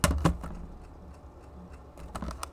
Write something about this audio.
Sound effects > Objects / House appliances
COMCam-Blue Snowball Microphone, MCU Canon DL 9000, Put Down, Pick Up Nicholas Judy TDC
A Canon DL-9000 camera being put down, then picked up.
Blue-brand, Blue-Snowball, camera, canon, dl-9000, foley, pick-up, put-down